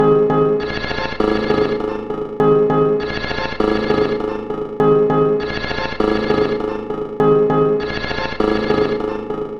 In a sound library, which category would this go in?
Instrument samples > Percussion